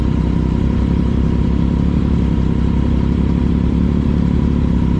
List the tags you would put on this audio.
Sound effects > Other mechanisms, engines, machines
Motorcycle
Supersport
Ducati

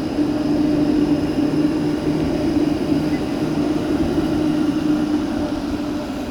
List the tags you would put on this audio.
Sound effects > Vehicles
tram
vehicle

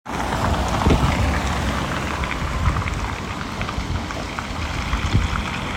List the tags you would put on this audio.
Sound effects > Vehicles
automobile
car
outside
vehicle

